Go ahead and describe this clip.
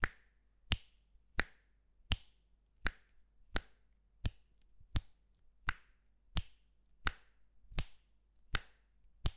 Sound effects > Human sounds and actions
Series of finger snaps Recorded with Tascam Portacapture X6